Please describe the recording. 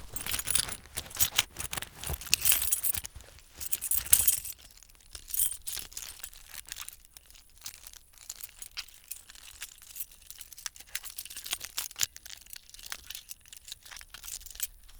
Sound effects > Objects / House appliances
Subject : My uncle fiddling his car keys in his hand while we were out to record the Brame. So this is in a field. Sennheiser MKE600 with stock windcover P48, no filter. Weather : Processing : Trimmed and normalised in Audacity.